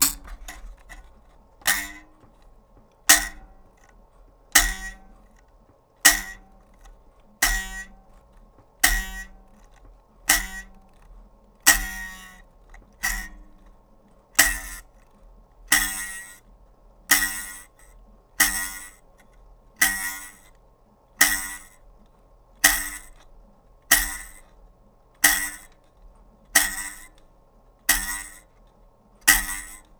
Sound effects > Objects / House appliances
TOONBoing-Blue Snowball Microphone, CU Large String Snaps with a Twang Nicholas Judy TDC
Large spring snaps with boings and twangs.
large, Blue-brand, spring, cartoon, boing, snap, Blue-Snowball, twang